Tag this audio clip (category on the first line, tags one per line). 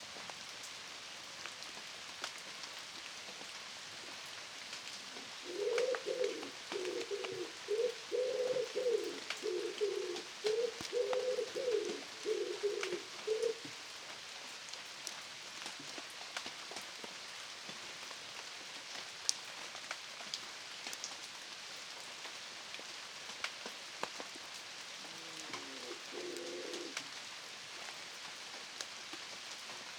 Soundscapes > Nature
bird
birds
field-recording
nature
pigeon
rain
weather
wood-pigeon
woodpigeon
Zoom-F3